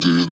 Speech > Solo speech
Random Brazil Funk Volcal Oneshot 2
Recorded with my Headphone's Microphone, I was speaking randomly, I even don't know that what did I say，and I just did some pitching and slicing works with my voice. Processed with ZL EQ, ERA 6 De-Esser Pro, Waveshaper, Fruity Limiter.
Acapella, EDM, Vocal